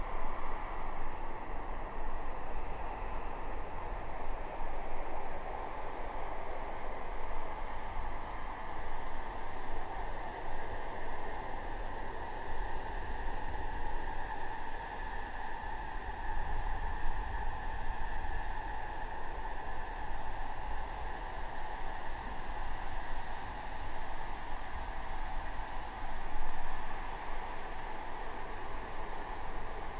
Soundscapes > Urban
wind through the antennas day ambience 2
Northern Cross Radio Telescope ambience recorded with zoom h6 at Medicina Radio Observatory
ambience, field-recording, day, telescope, antenna